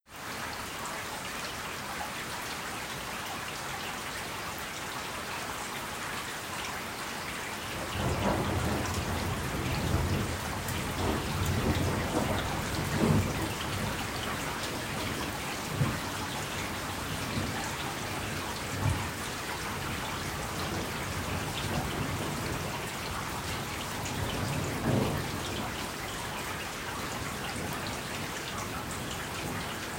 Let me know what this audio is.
Soundscapes > Nature
Thunder + Rain - Courtyard
There was a thunder storm with heavy rains. I recorded the best part in the courtyard. The storm is distant and the gutters full of flowing water. * No background noise. * No reverb nor echo. * Clean sound, close range. Recorded with Iphone or Thomann micro t.bone SC 420.
ambience, ambient, blast, bolt, detonation, downpour, field-recording, flash, gutter, heat, hot, lightning, nature, outburst, rain, raining, rolling-thunder, rumble, rumbling, shower, storm, summer, thunder, thunderbolt, thunder-storm, thunderstorm, water, weather